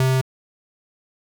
Sound effects > Electronic / Design
Start, stop or jumping sound fx.
This could be used for for a number of means, such as sound FX for old classic arcade games. Note C3. This sound was not created using A.I. Created using a Reason 12 synthesizer.
1980s, gaming